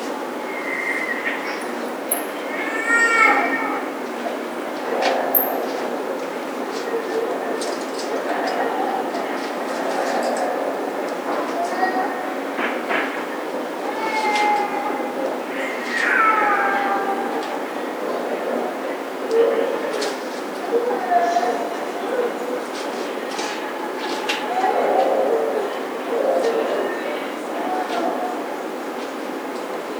Soundscapes > Urban
250811 05h18 Albi 60ish Rue Rinaldi - Babycrying street ambience
Subject : Date YMD : 2025 August 11 Early morning : Location : Albi 81000 Tarn Occitanie France. NT5 with a omni capsule (NT5-o). Weather : 24°c ish 60% humidity clear sky, little to no wind (said 10km/h, most locations I was was shielded) Processing : Trimmed and normalised in Audacity.